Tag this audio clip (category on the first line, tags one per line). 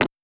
Sound effects > Human sounds and actions
rocks
walking
synth
stone
footstep
steps
lofi